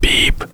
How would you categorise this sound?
Speech > Solo speech